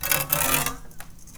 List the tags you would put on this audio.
Sound effects > Other mechanisms, engines, machines
foley fx handsaw hit household metal metallic perc percussion plank saw sfx shop smack tool twang twangy vibe vibration

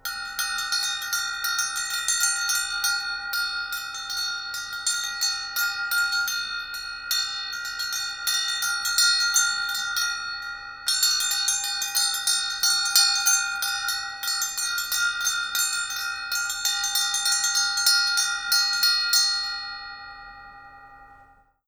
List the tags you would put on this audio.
Music > Solo percussion
bell Blue-brand Blue-Snowball claw elephant elephant-claw-bell hindi hindu hinduism india indian ring short

